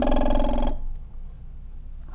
Sound effects > Objects / House appliances
knife and metal beam vibrations clicks dings and sfx-088
Foley, Metal, Perc, SFX, ting, Trippy, Vibrate, Vibration